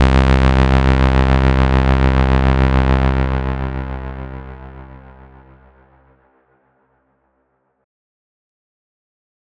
Instrument samples > Synths / Electronic
Deep Pads and Ambient Tones22
Ambient, Analog, bass, bassy, Chill, Dark, Deep, Digital, Haunting, Note, Ominous, Oneshot, Pad, Pads, Synth, Synthesizer, synthetic, Tone, Tones